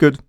Speech > Solo speech
Relief - Good
oneshot, dialogue, Video-game, Mid-20s, Single-take, Vocal, U67, singletake, releif, happy, Neumann, Voice-acting, FR-AV2, Human, Relief, Male, Rileaf, voice, good, talk, NPC, Tascam, Man